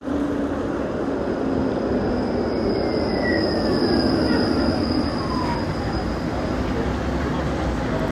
Sound effects > Vehicles
Tram sound
Tram arrival and departure sequences including door chimes and wheel squeal. Wet city acoustics with light rain and passing cars. Recorded at Sammonaukio (17:00-18:00) using iPhone 15 Pro onboard mics. No post-processing applied.
15, city, iPhone, light, mics, Pro, rain, Tram